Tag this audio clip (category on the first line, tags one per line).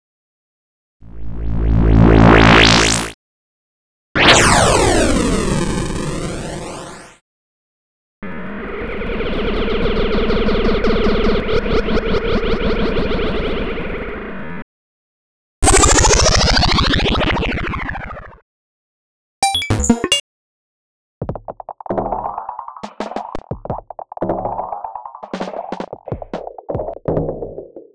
Sound effects > Electronic / Design
Arcade
Lo-Fi
Machinery